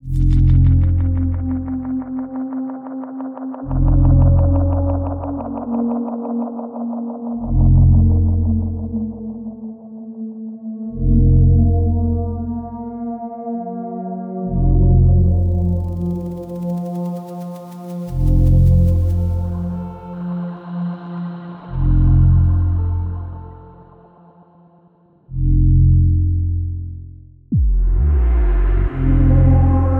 Soundscapes > Synthetic / Artificial
wanted to make some scifi multiplayer game lobby type ambience and came up with this droning atmosphere using og massive, analog lab and serum 2 inside of ableton. made sure it loops pretty nicely. F Phrygian dominant.